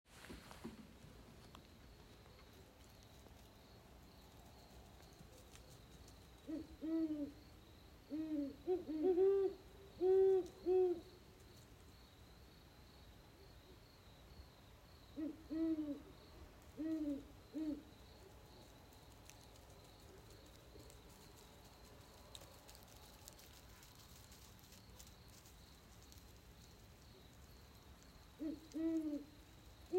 Soundscapes > Nature
Great horn owls 08/04/2023
Great horn owl
ambiance, birds, country, field-recording, great-horn-owl, nature, south-spain